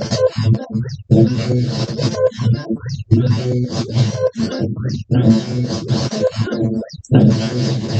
Sound effects > Electronic / Design
Stirring The Rhythms 19
content-creator, dark-design, dark-soundscapes, dark-techno, drowning, glitchy-rhythm, industrial-rhythm, noise, noise-ambient, PPG-Wave, rhythm, science-fiction, sci-fi, scifi, sound-design, vst, weird-rhythm, wonky